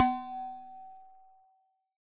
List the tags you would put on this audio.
Sound effects > Objects / House appliances
percusive
recording
sampling

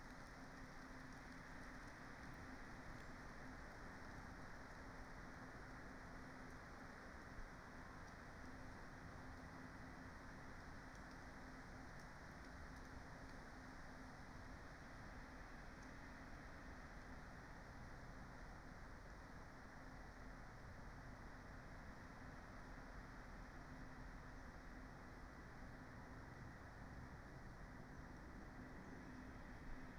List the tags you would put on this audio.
Soundscapes > Nature
soundscape
data-to-sound
weather-data
nature
natural-soundscape
alice-holt-forest
artistic-intervention
raspberry-pi
Dendrophone
sound-installation
modified-soundscape
field-recording
phenological-recording

